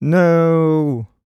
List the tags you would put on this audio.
Speech > Solo speech
Shotgun-microphone
dramatic
Tascam
MKE600
Male
2025
Sennheiser
Generic-lines
MKE-600
Calm
Shotgun-mic
Single-mic-mono
FR-AV2
VA
Voice-acting
nooo
Adult
no
mid-20s
Hypercardioid
july
sarcastic

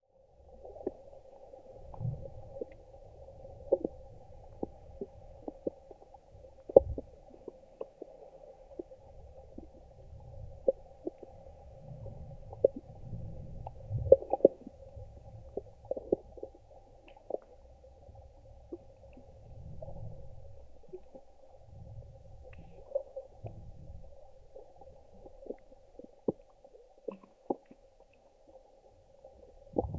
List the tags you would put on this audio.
Sound effects > Other
Drone
geofon
splash
water

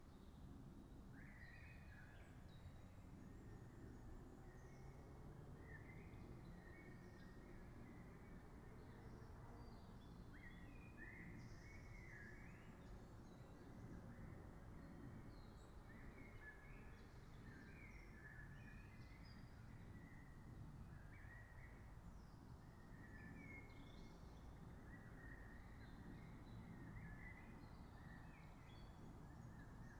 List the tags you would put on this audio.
Soundscapes > Nature
natural-soundscape
alice-holt-forest
data-to-sound
weather-data
Dendrophone
modified-soundscape
soundscape
sound-installation
phenological-recording
artistic-intervention
raspberry-pi
nature
field-recording

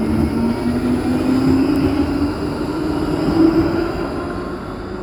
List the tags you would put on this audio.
Sound effects > Vehicles
tramway
transportation
vehicle

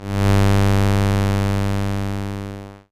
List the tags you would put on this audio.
Sound effects > Experimental
analogue
dark
electro
mechanical
sample
sci-fi
sweep
synth

